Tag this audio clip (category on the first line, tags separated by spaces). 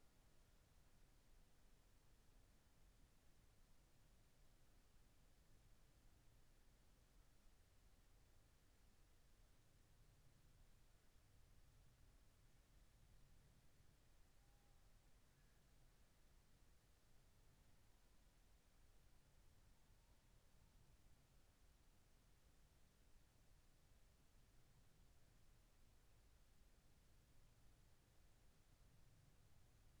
Soundscapes > Nature
nature Dendrophone natural-soundscape soundscape field-recording phenological-recording weather-data alice-holt-forest sound-installation data-to-sound artistic-intervention modified-soundscape raspberry-pi